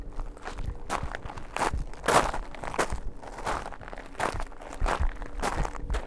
Sound effects > Natural elements and explosions
Sounds from the backyard
Nature, Outside, Fieldrecording